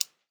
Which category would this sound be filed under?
Sound effects > Human sounds and actions